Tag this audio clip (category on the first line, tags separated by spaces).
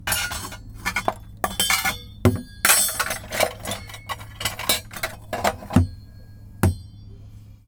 Sound effects > Objects / House appliances
Robot Perc Clank FX Metallic dumping dumpster Metal rattle waste Ambience Atmosphere Foley scrape Smash rubbish Machine Percussion garbage SFX Junk trash Bang Robotic tube Environment Dump Clang Junkyard Bash